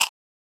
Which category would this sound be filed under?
Sound effects > Objects / House appliances